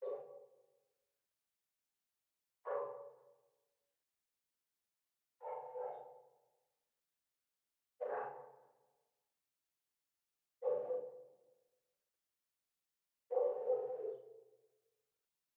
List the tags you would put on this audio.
Sound effects > Animals
away field-recording ambience barking residential bark urban

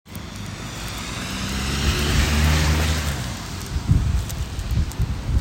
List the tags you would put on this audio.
Sound effects > Vehicles
car field-recording

